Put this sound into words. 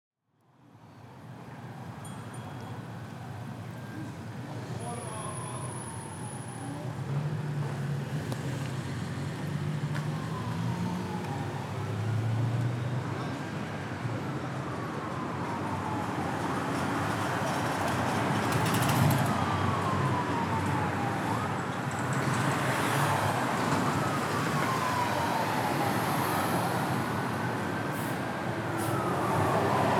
Sound effects > Human sounds and actions
Loud urban siren, beeping delivery truck, zooming motorcycle, a maniac
A nice mix of an ambulance siren, traffic, motorcycles, a maniac jabbering at passersby on the sidewalk. Recorded in AB omnis on a busy Washington, DC street.
ambiance, ambience, ambient, ambulance, atmos, atmosphere, city, field-recording, general-noise, night-time, noise, passersby, people, sidewalk, siren, soundscape, street, traffic, urban